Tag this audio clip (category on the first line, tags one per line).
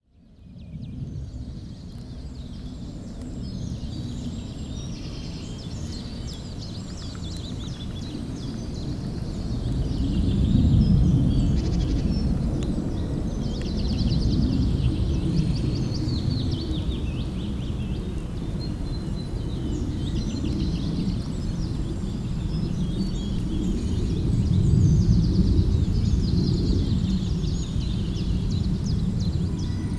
Soundscapes > Nature
ambience
birds
field
morning
nature
recording